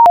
Sound effects > Electronic / Design
UV-5RM "entering something" sfx
created in audacity with sine wave generator, listened to frequency using android app spectroid the radio usually makes this sound when short pressing the flashlight button, or when voice is disabled NOAA, FM or menu is entered
electronic, radio, sine-wave